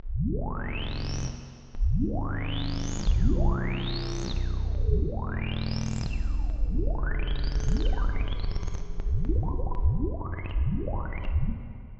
Soundscapes > Synthetic / Artificial
PPG Wave 2.2 Boiling and Whistling Sci-Fi Pads 7
science-fiction, noise, vst, dark-soundscapes